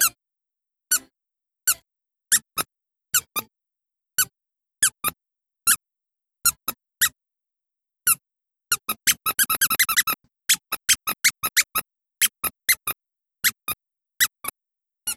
Sound effects > Objects / House appliances
TOYMisc-Samsung Galaxy Smartphone, MCU Dog Toy, Squeaking 01 Nicholas Judy TDC
A dog toy squeaking. Recorded at Five Below.